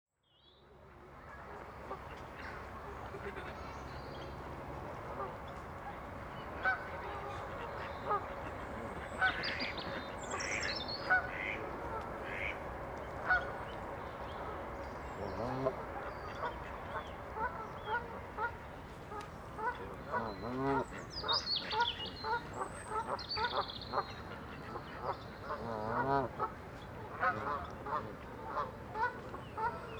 Soundscapes > Nature
A morning recording at Wolseley Nature Reserve, Staffordshire. Zoom H6 Studio, Mono. XY Mics.